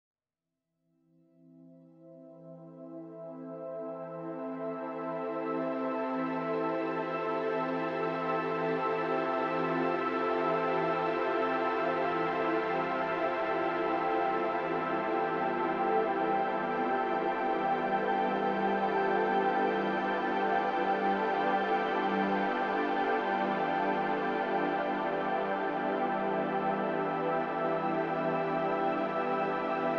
Soundscapes > Synthetic / Artificial
Ambient, Pad Piano - Event Horizon
Calm... Ambient serenity. Drift away with beautiful, expansive pads. It can be ideally used in your projects. Not used ai-generated. 90 Bpm Thank you!
ambience, ambient, atmosphere, atmospheric, calm, chill, deep, drone, electronic, emotional, experimental, meditation, music, pad, piano, relax, sci-fi, soundscape, space